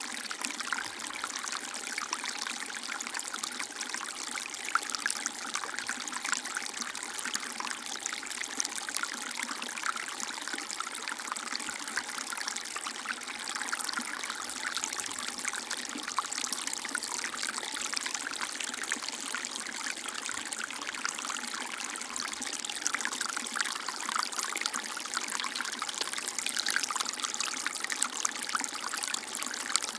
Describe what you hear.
Soundscapes > Nature
Medium Stream small drops
stream, water, waterstream, drops, river, trickle